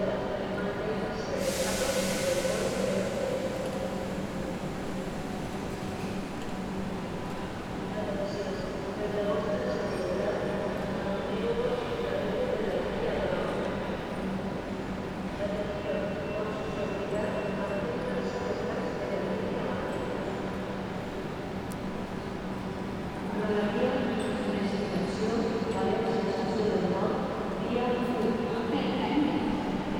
Soundscapes > Urban
WAITING ROOM (LOBBY)
Recording the waiting zone of the train station, where there are some seats and some people waiting to take his holes trains.
DIALYSOUNDS, PEOPLE, PUBLICADRESS, TRAIN